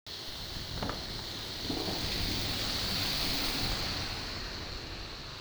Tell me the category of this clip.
Sound effects > Vehicles